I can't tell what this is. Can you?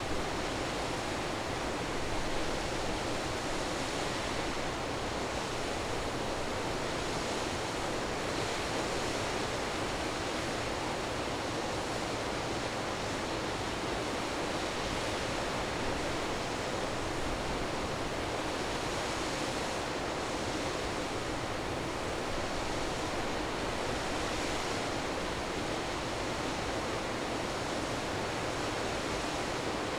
Soundscapes > Nature
Waterfall, large distance
The Loup of Fintry in Scotland from afar. ORTF recording with Line Audio CM4's.
nature, loup-of-fintry, waterfall, field-recording